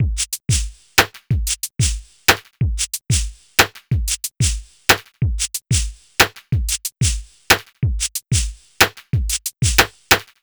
Music > Solo percussion
Electro Latin 92 bpm
This is four bars of a latin-inspired electro groove, fully loopable, that can be used for various kinds of genres. It has both a 92-bpm and a double-time feel to it. I built it in Hydrogen, using the Korg Wavestation drum kit created by Seth Kenlon and Klaatu for the Second Great Linux Multimedia Sprint.